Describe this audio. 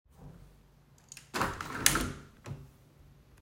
Sound effects > Objects / House appliances
A brief, mechanical sound of a window being closed. First, you hear the metal handle moving, followed by a distinct click when the window locks into place. The sound has a dry, sharp tone that is typical of a window lock. I used my IPhone as a recording device and captured the sound in my own room.